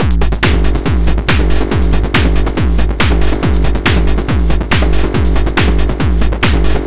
Music > Other
microtonic140 bpm
FL studio 9 + vst microtonic